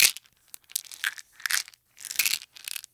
Sound effects > Objects / House appliances
Pill Bottle Shake 9
Pills bottle sound effects